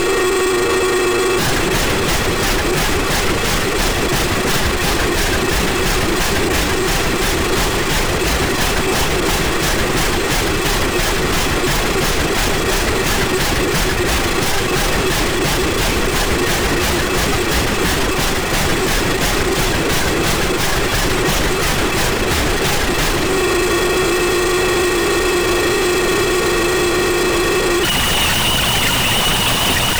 Music > Solo percussion
Simple Bass Drum and Snare Pattern with Weirdness Added 041
Experiments-on-Drum-Beats, Silly, Glitchy, FX-Drum-Pattern, Simple-Drum-Pattern, Snare-Drum, Interesting-Results, FX-Laden-Simple-Drum-Pattern, FX-Drums, Experimental-Production, Experiments-on-Drum-Patterns, FX-Laden, Bass-and-Snare, Bass-Drum, Noisy, FX-Drum, Fun, Experimental, Four-Over-Four-Pattern